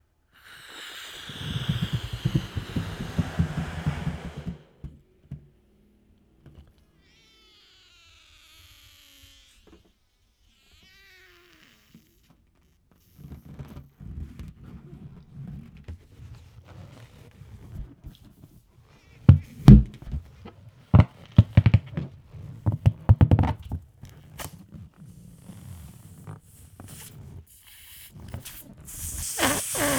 Sound effects > Objects / House appliances
Balloon Blow Up, Deflate, and Handling
I inflated a balloon, handled it to get some squeaking sounds. Then deflated it slowly with squeaking, and once more with a pbtpbthbp sound Recorded using an external Rode video shotgun mic on a Zoom H1essential recorder
squeak, air, squeaking, blowup, inflate, pressure, raspberry, deflate, balloon, inflating